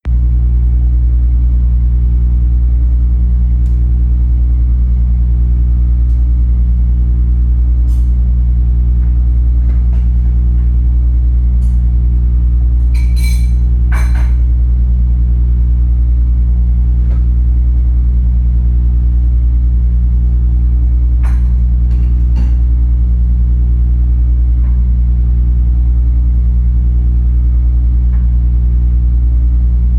Soundscapes > Indoors
ac and roomate
Recording of the deep noise produced by the secret locked door in my apartment containing the AC unit and water heater. My roommate was doing some chores in the background, adding an extra layer of noise.
ac, apartment, iphone